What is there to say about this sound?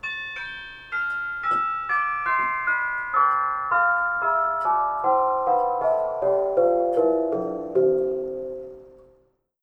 Music > Solo percussion
MUSCBell-Blue Snowball Microphone, CU Tubular, Down The Scale Nicholas Judy TDC
A tubular bell down the scale. Recorded using a Casio keyboard.